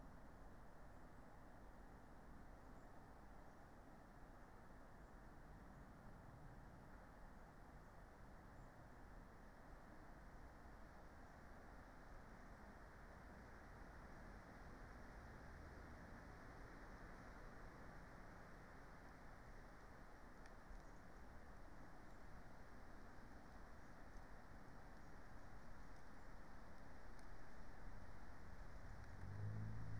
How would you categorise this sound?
Soundscapes > Nature